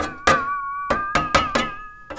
Sound effects > Other mechanisms, engines, machines
Handsaw Pitched Tone Twang Metal Foley 11
Handsaw fx, tones, oneshots and vibrations created in my workshop using a 1900's vintage hand saw, recorded with a tascam field recorder
foley, fx, handsaw, hit, household, metal, metallic, perc, percussion, plank, saw, sfx, shop, smack, tool, twang, twangy, vibe, vibration